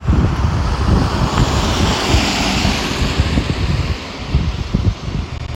Sound effects > Vehicles
Car going 11
car, drive, engine, outdoor, road, tampere